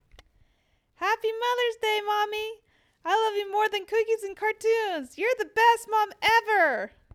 Speech > Solo speech
Children's Mother's Day Message – Cute and Innocent
A sweet, child-like Mother's Day voice-over. Innocent and adorable tone, perfect for cute videos, cards, and heartfelt messages. Script: "Happy Mother's Day, Mommy! I love you more than cookies and cartoons! You’re the best mom ever!"
VoiceOver, MothersDay, CuteVoice, HappyMothersDay, ILoveMom, KidsMessage